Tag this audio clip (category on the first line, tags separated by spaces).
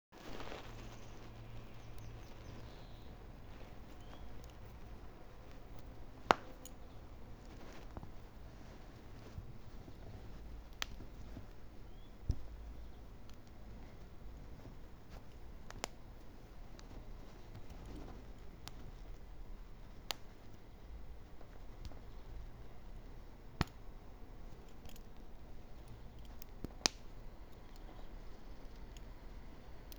Sound effects > Human sounds and actions
atmophere recording field